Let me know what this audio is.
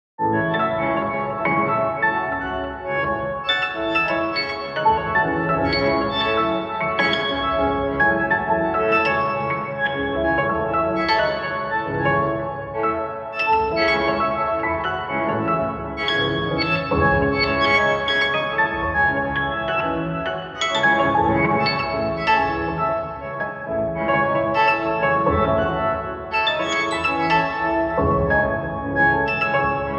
Music > Solo instrument
Processed with Khs Convovler, Khs 3-band EQ, Khs Slice EQ, Khs Multipass, ZL EQ, Fruty Limiter.